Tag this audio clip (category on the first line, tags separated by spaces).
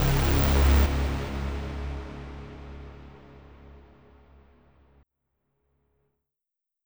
Instrument samples > Synths / Electronic
140bpm audacity flstudio24 tforce T-Force-Alpha-Plus